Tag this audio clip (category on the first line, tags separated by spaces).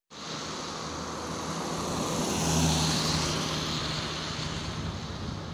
Sound effects > Vehicles
automobile car vehicle